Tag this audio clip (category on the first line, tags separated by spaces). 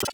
Instrument samples > Percussion
FX Digital Cymbal Effect Glitch